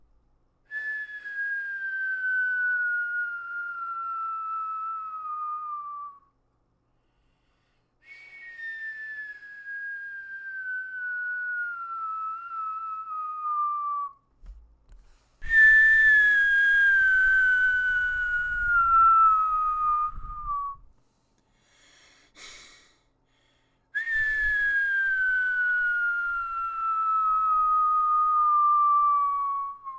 Sound effects > Natural elements and explosions
FRWKRec-Blue Snowball Microphone, CU Skyrockets Whistling Nicholas Judy TDC
Blue-brand, Blue-Snowball, cartoon, skyrocket, whistle